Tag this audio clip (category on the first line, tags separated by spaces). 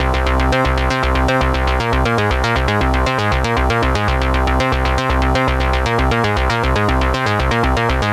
Music > Solo instrument
Loop Synth Vintage Bass Synthesizer